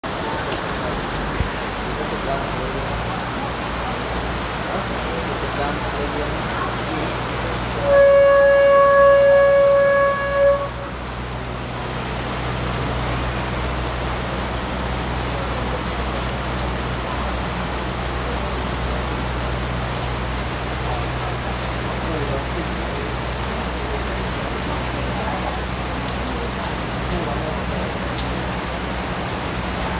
Soundscapes > Nature
Outside In Heavy Rain, Urban Traffic
Heavy rain, from outside. Pedestrians talking and cars driving past.